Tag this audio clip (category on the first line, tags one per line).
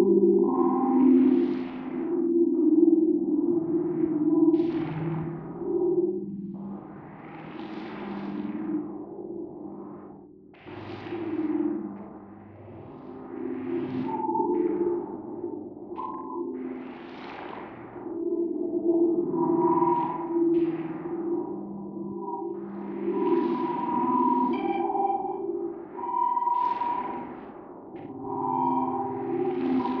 Soundscapes > Synthetic / Artificial
ambience
space
synth
textural